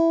Instrument samples > String
A random guitar tone that is shortened. Good for experiments. Good for sound design. The pack contains tones that create an arpeggio one after the other.
design, sound, guitar, arpeggio, cheap, tone, stratocaster